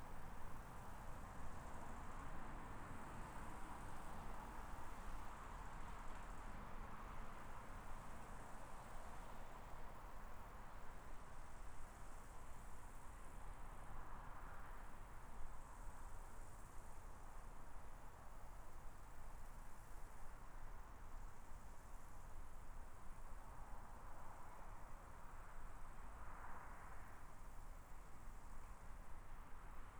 Soundscapes > Urban
AMBInsc Bow-winged grasshoppers on a hill by the busy roads, Karlskrona, Sweden
Recorded 10:24 16/08/25 In a dry grassy field on top of the Blåport hill on a summer day. While cars from a highway and road drive by lots of bow-winged grasshoppers are heard, competing with the traffic in loudness. Also a pigeon, and a nearby flagpole is heard at the end. Zoom H5 recorder, track length cut otherwise unedited.
Daytime, Hill, Bow-winged, Road, Field, Stridulating, Grasshopper, Traffic, Town, Summer, Grass, Cars, Field-Recording, Pigeon